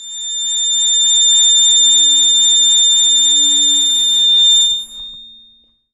Sound effects > Experimental
Loud High Pitched Metal Screech
Recorded from a plain wooden clothing hanger. The metal piece of the clothing hanger was rubbing against the metal part of the rack slowly. Making that loud, ear piercing sound.